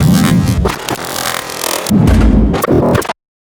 Sound effects > Other mechanisms, engines, machines
Sound Design Elements-Robot mechanism-001
automation, circuitry, feedback, hydraulics, mechanism, metallic, motors, movement, operation, robot, robotic, sound